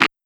Sound effects > Human sounds and actions
LoFiFootsteps Stone Running-07
Shoes on stone and rocks, running. Lo-fi. Foley emulation using wavetable synthesis.